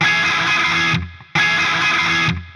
Music > Solo instrument
Thrilling guitar strokes
The intention is to sound treble hitting the third second and first stings. Raw, powerful guitar riffs! These are the true sound of a rocker, not machines. Crafted with a real Fender guitar and AmpliTube 5, you're getting genuine, unadulterated guitar energy. Request anything, available to tour or record anywhere!
guitar, metal, rock